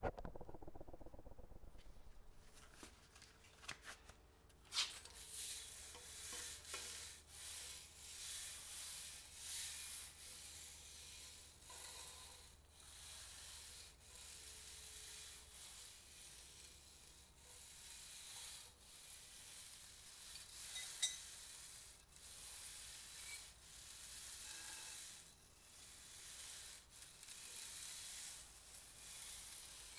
Soundscapes > Urban
Pieces of metal wrapped in plastic brushed against a concrete floor. Recorded with a Zoom h1n.